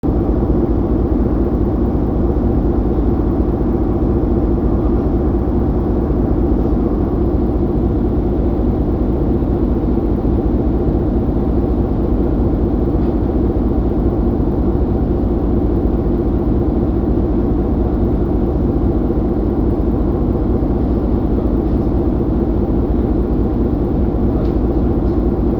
Soundscapes > Indoors
Saloon of an older boat without passengers
Soundscape of the saloon of an older bigger boat. Contains background engine noise and some ambience. Recorded using a phone microphone closer to the "engine side" of the saloon/boat.
Humming, Machinery, Noise